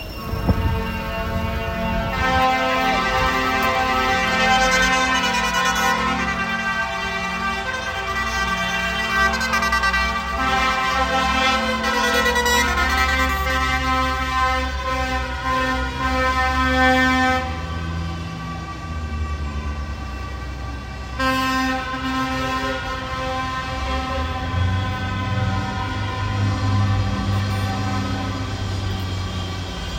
Sound effects > Other
Tractor Horns
Tractors' horns at a farmer's protest in Oxford, UK. One of them plays a tune. A crossing beep is briefly heard at the start of the clip. Recorded on an iPhone 12 Pro.
horn, noise, protest